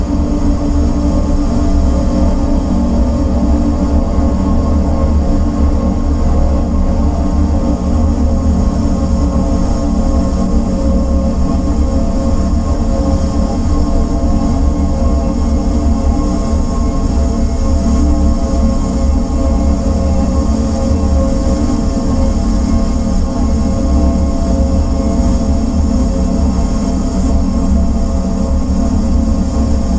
Sound effects > Experimental
"I was greeted with sounds from within upon entering the cavernous space." For this sound effect I first recorded ambient noises in my home using a Zoom H4n multitrack recorder. Those files were then imported into Audacity where they were layered and worked with until a final audio file was exported for sharing.
ambient, choir, oscillation, singing, voices